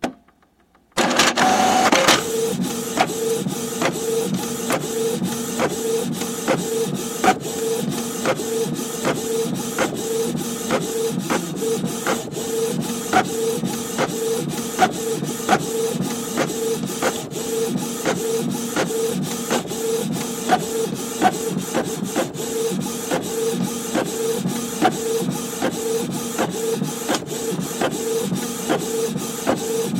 Sound effects > Objects / House appliances
canon pixma print colour1
Recording of a Canon Pixma TS3720 all-in-one printer. To get this recording, the microphones were placed inside the edge of the printer. Then we set the printer off. Do not attempt this yourself without an expert assisting you, as I had a printers expert with me when setting this up.
ASMR, Canon, Canon-Pixma, computer, ink-jet, Inkjet, mechanical, nk-jet, nostalgia, office, Pixma, printer, printing, robot, technology, work